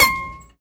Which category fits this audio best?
Sound effects > Other